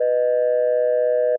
Instrument samples > Synths / Electronic
Landline Phonelike Synth B5
Holding-Tone, JI, JI-3rd, JI-Third, just-minor-3rd, just-minor-third, Landline, Landline-Holding-Tone, Landline-Phone, Landline-Phonelike-Synth, Landline-Telephone, Landline-Telephone-like-Sound, Old-School-Telephone, Synth, Tone-Plus-386c